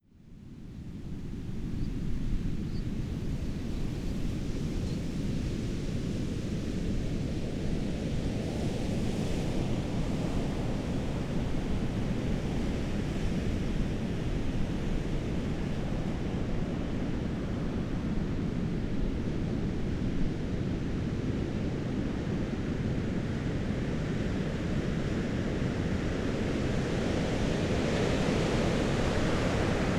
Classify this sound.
Soundscapes > Nature